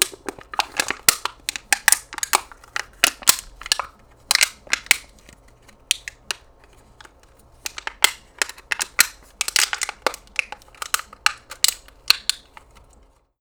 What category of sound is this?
Sound effects > Objects / House appliances